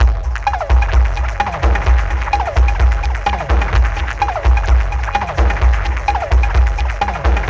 Music > Solo percussion
128 CR5000 Loop 02
AnalogDrum, Roland, 80s, Vintage, Electronic, DrumMachine, CR5000, Beat, CompuRhythm, Drum, Loop, Analog, Synth, Drums, music, 128bpm